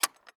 Sound effects > Other mechanisms, engines, machines
Gate latch
Sound of a farm door latching
Antique, Gate, Latch, Latching, Mechanical